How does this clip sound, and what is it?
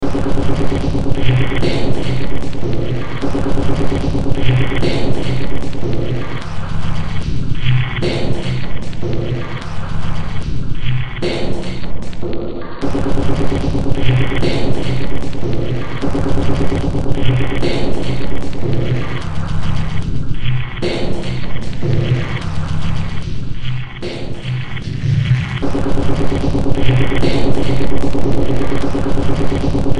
Music > Multiple instruments
Short Track #4010 (Industraumatic)
Ambient, Cyberpunk, Games, Horror, Industrial, Noise, Sci-fi, Underground